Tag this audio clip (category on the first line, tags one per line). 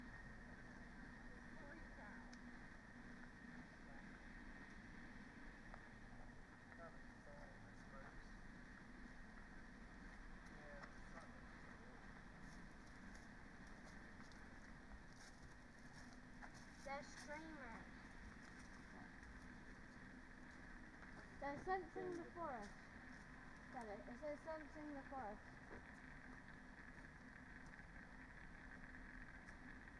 Soundscapes > Nature
Dendrophone
weather-data
nature
field-recording
alice-holt-forest
data-to-sound
modified-soundscape
natural-soundscape
soundscape
artistic-intervention
phenological-recording
raspberry-pi
sound-installation